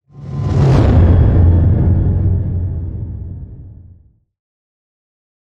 Sound effects > Other
Sound Design Elements Whoosh SFX 004
cinematic, motion, swoosh, ambient, design, whoosh, sound, element, audio, effect, dynamic, trailer, fast, production, effects, elements, film, sweeping, movement